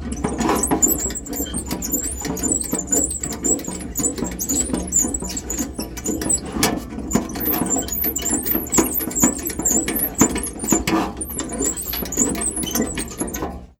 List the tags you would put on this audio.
Sound effects > Objects / House appliances
foley jack-in-the-box Phone-recording wind-up

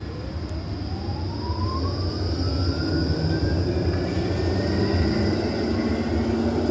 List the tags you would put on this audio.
Sound effects > Vehicles
vehicle tram transportation

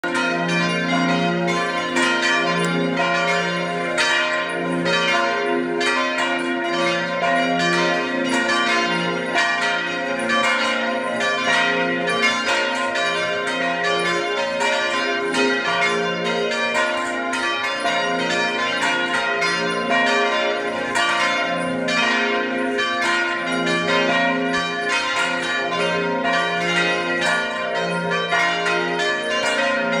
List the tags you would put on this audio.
Instrument samples > Other
Ringing Bells Religion

